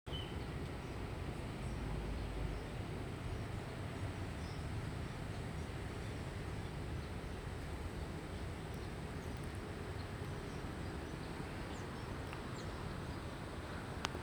Soundscapes > Urban
ambiance with distant traffic jogging track 01
A recording of the ambiance on the jogging track area of Diponegoro University. Recorded relatively deep into the park, sounds of nature, wind, running water, and distant vehicles can be heard.
distant, urban, soundscapes, vehicles, park, track, jogging